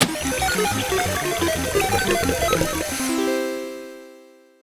Sound effects > Other mechanisms, engines, machines
Biohacker SampleDNA
Sound effect made for a computer that samples a vat of DNA and makes a chime when complete.